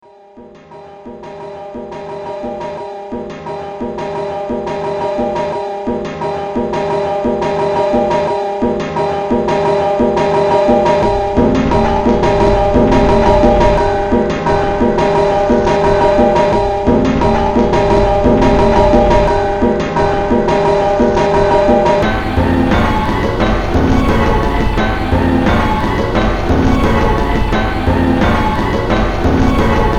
Music > Multiple instruments
Demo Track #2980 (Industraumatic)
Ambient, Cyberpunk, Games, Horror, Industrial, Noise, Sci-fi, Soundtrack, Underground